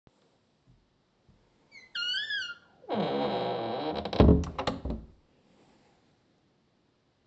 Soundscapes > Indoors
Creaking wooden door v03
Door, Room, Wooden